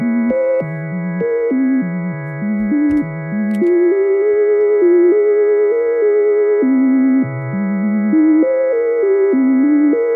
Soundscapes > Synthetic / Artificial
Extreme High NR
Sonification of extreme net radiation, with pronounced vibrato and shimmering texture to reflect intense solar energy.
Climate, PureData, SensingtheForest, Sonification